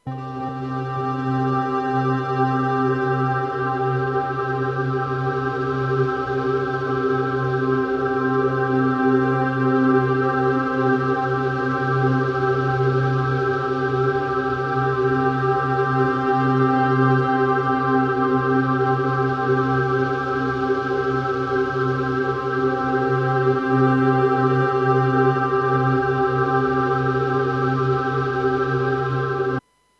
Instrument samples > Synths / Electronic
Synth Pad C3
C3 note synth pad